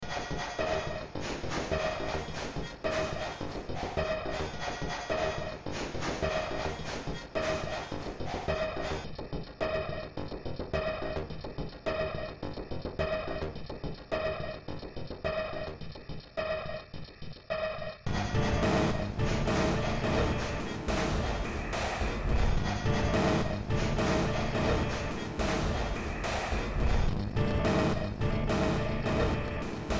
Music > Multiple instruments
Demo Track #4002 (Industraumatic)
Soundtrack, Ambient